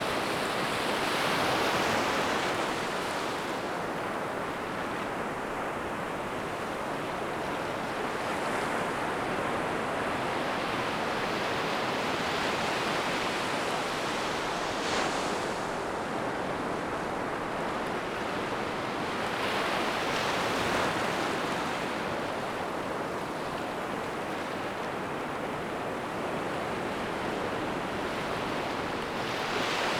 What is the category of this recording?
Soundscapes > Nature